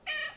Sound effects > Animals
Richard is my cat. Here is a sound he made.
meow, pet, cat